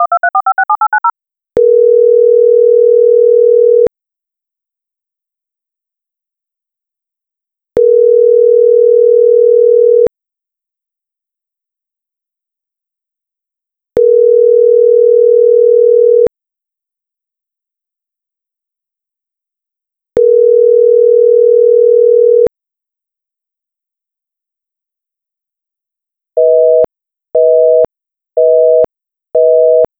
Sound effects > Electronic / Design
dtmf tones for 1 2 3 4 5 6 7 8 9 and 0, a phone tone, and a busy signal. completely made in audacity. dtmf tones generated by audacity. phone tone made from scratch by combining 440hz and 480hz. busy signal made from scratch by combining tones 500hz and 650hz.